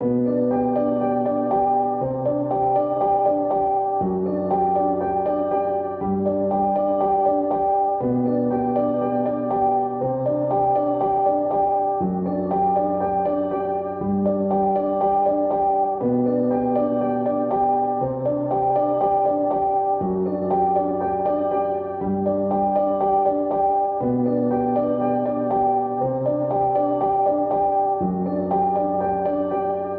Music > Solo instrument
Piano loops 051 efect 4 octave long loop 120 bpm
Otherwise, it is well usable up to 4/4 120 bpm.